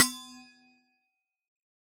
Sound effects > Objects / House appliances
Resonant coffee thermos-004
recording, sampling, percusive